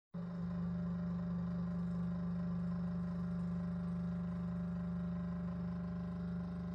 Sound effects > Objects / House appliances
Malfunctioning Heater
An audio recording of the heater in my student dorm malfunctioning
Heater, malfunctioning, rattling